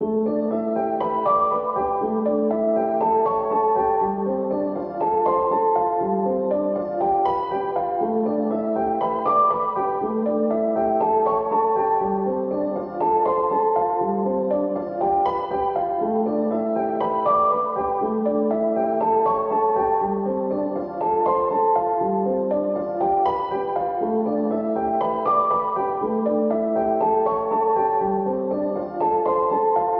Music > Solo instrument
Piano loops 071 efect 4 octave long loop 120 bpm
pianomusic, 120, 120bpm, music, free, loop